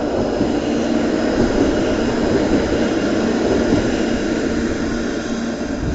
Soundscapes > Urban
Passing Tram 24
city, urban